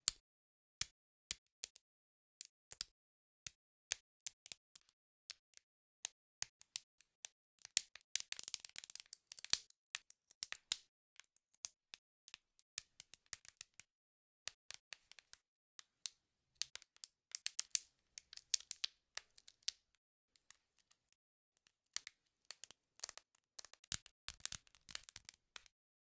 Sound effects > Natural elements and explosions
Processed cracking bark to simulate sparks. To be added for a campfire atmosphere in a soundscape. Studio-Recording; recorded on a Røde NTG 2, MOTU M2 and Reaper. Sound processing: INA GRM Tools Shuffle.